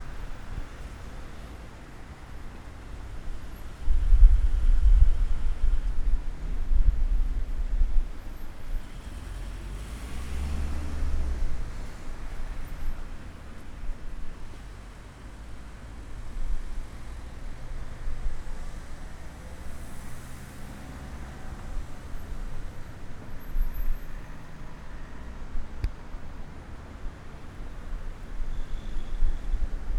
Soundscapes > Urban
STeDe traffic 11.08 am
Recorded with zoom H1 essential
FieldRecording, Traffic, Padova